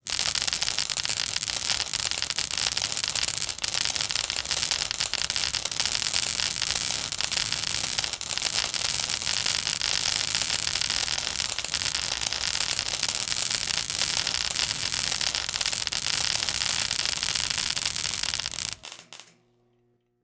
Sound effects > Natural elements and explosions
custom zapping continuous electricity arcing sounds 10022025
continuous electricity zapping spark sounds inspired by tmnt 2012 and randy cunningham 9th grade ninja. can be used for robots already been stabbed or decapitated.
arc, cracking, electrical, electricity, heat, hot, ion, power, sci-fi, sizzle, sparkling, TMNT, welder, zapping